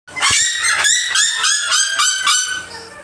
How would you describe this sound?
Sound effects > Animals
Miscellaneous Birds - Red-legged Seriema
Recorded with an LG Stylus 2022 at Hope Ranch. This is the call of a red-legged seriema, close relatives to the prehistoric terror birds.
south-america, aviary, call, screech, exotic, zoo, bird